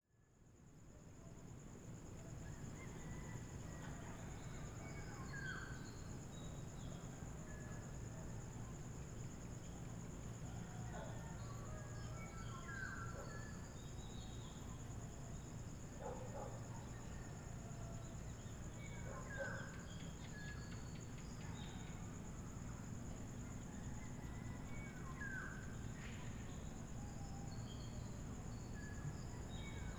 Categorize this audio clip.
Soundscapes > Urban